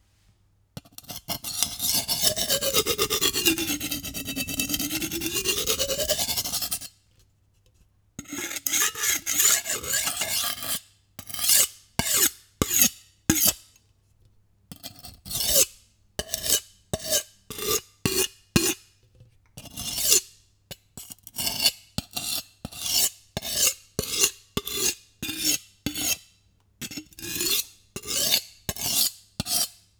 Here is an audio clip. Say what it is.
Sound effects > Objects / House appliances
Nakiri Knife sharpening 01
Subject : Sharpening a knife with a old laguiole sharpening stone (somewhat "knife blade" shaped itself.) Date YMD : 2025 July 20 Location : In a kitchen. Sennheiser MKE600 P48, no filter. Weather : Processing : Trimmed in Audacity. Notes : Recorded for Dare2025-09 Metal Friction series of dares.